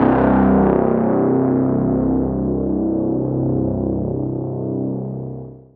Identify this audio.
Instrument samples > Synths / Electronic
CVLT BASS 71
bassdrop, drops, lfo, stabs, sub, synth